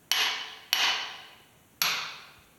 Sound effects > Objects / House appliances
Hitting a railing. Recorded with my phone.
metal, thud, hit, reverb, railing, impact